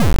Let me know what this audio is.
Instrument samples > Percussion
bad square bass drum
made using openmpt. yippee
hard, square, bassdrum, drum